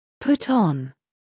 Speech > Solo speech
put on
pronunciation, english, voice, word